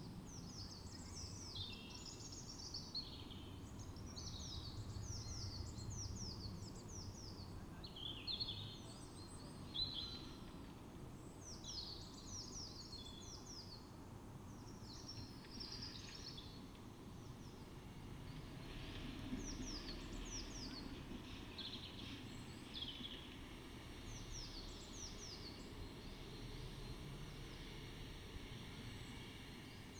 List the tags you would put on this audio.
Soundscapes > Nature
field-recording modified-soundscape artistic-intervention data-to-sound natural-soundscape soundscape alice-holt-forest sound-installation phenological-recording nature weather-data raspberry-pi Dendrophone

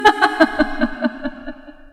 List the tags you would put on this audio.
Sound effects > Human sounds and actions
laugh chuckle laughing voice woman giggle eerie laughter female reverb haha

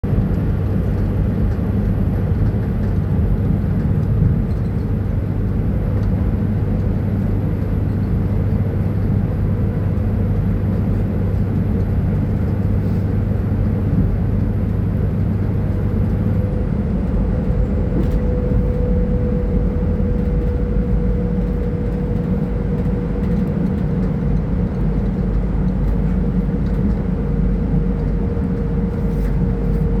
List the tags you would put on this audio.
Soundscapes > Urban
transport travel train wagon

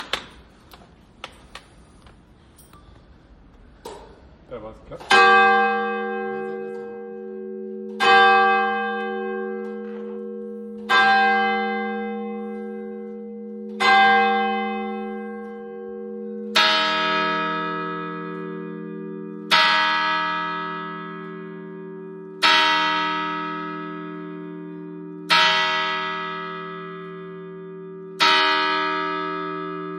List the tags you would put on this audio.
Sound effects > Other mechanisms, engines, machines
saxonia lower Nienburg

